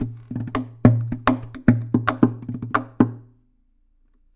Music > Solo instrument
acoustic guitar tap 7
instrument, pretty, acosutic, strings, knock, chords, twang, solo, chord, string, slap, dissonant, riff, guitar